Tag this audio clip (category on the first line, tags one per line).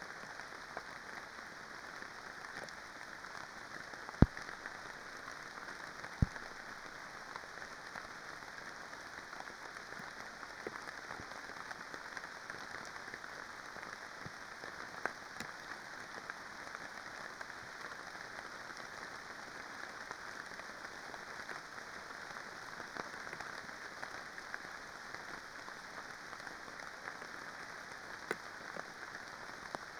Soundscapes > Nature
artistic-intervention
phenological-recording
sound-installation
raspberry-pi